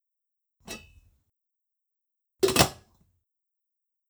Sound effects > Objects / House appliances
Taking a saucepan Lid off and putting it back on
A sound bite recording on removing then putting back on a glass lid of a stainless steel saucepan. Made by R&B Sound Bites if you ever feel like crediting me ever for any of my sounds you use. Good to use for Indie game making or movie making. This will help me know what you like and what to work on. Get Creative!